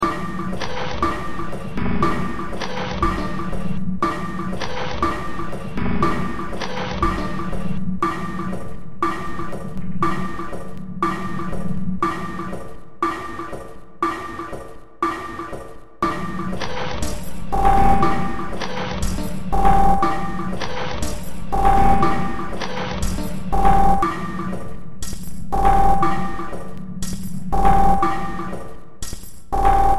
Music > Multiple instruments
Demo Track #3311 (Industraumatic)
Horror, Soundtrack, Sci-fi, Games, Noise, Underground, Cyberpunk, Industrial, Ambient